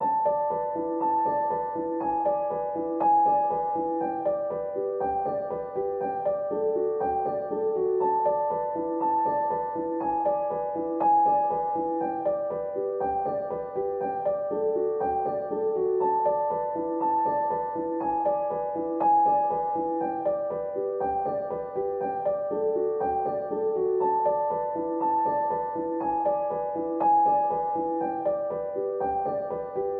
Music > Solo instrument
Piano loops 200 octave up short loop 120 bpm
simplesamples, 120, 120bpm